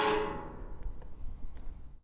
Sound effects > Objects / House appliances
Junkyard Foley and FX Percs (Metal, Clanks, Scrapes, Bangs, Scrap, and Machines) 39
Ambience, Bash, Clang, Dump, dumping, dumpster, FX, Junk, Junkyard, Metallic, Perc, Percussion, rattle, Robotic, rubbish, scrape, SFX, Smash, trash, tube